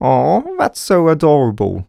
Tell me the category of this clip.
Speech > Solo speech